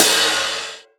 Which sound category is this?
Instrument samples > Percussion